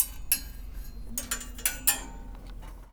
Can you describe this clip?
Sound effects > Objects / House appliances
Junkyard Foley and FX Percs (Metal, Clanks, Scrapes, Bangs, Scrap, and Machines) 66
Bang, Clang, Clank, Dump, dumpster, Environment, Foley, FX, garbage, Junk, Junkyard, Metal, Perc, Percussion, rattle, Robot, Robotic, scrape, trash, tube, waste